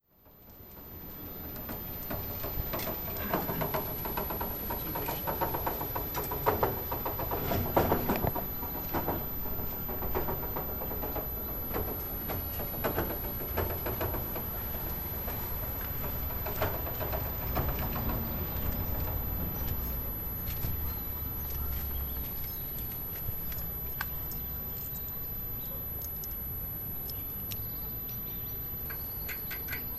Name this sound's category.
Sound effects > Other mechanisms, engines, machines